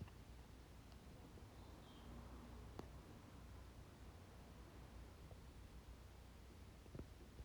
Soundscapes > Nature
AMBBird birdsong bird sing city room tone DOI FCS2
bird, outdoor